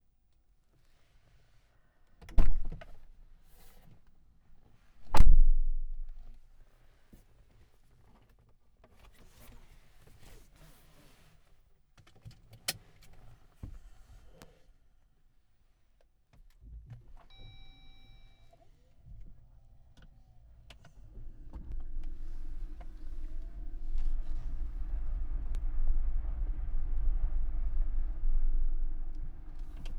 Sound effects > Vehicles
Jeep-4xe-Slow-Binaural-F2025
Jeep: door opens, closes, seatbelt fastened, car starts, slow drive. Recorded with a binaural microphone on a Zoom H6
Driving, Start-Stop, Jeep